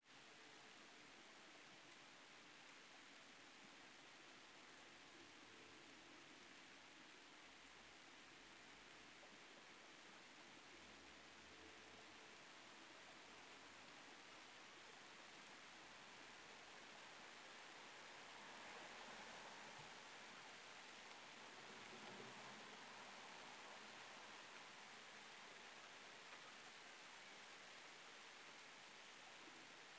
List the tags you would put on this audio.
Soundscapes > Urban
birds car field field-recording forest germany nature rain soft wet

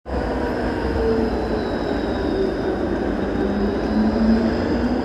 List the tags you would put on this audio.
Sound effects > Vehicles
city public-transport tram

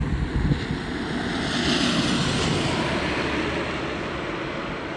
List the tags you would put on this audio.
Soundscapes > Urban
car city driving tyres

